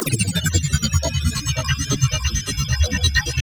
Sound effects > Electronic / Design
FX-Downlifter-Glitch Downlifter 7
Downlifter, Downsweep, Drop, Effect, FX, Glitch